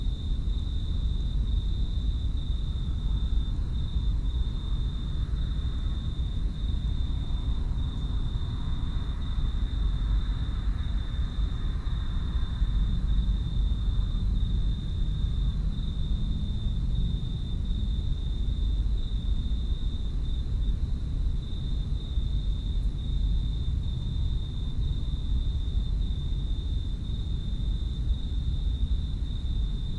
Soundscapes > Urban
Nighttime In Suburbs
Suburban area at nighttime with some cars and trains passing by in the distance.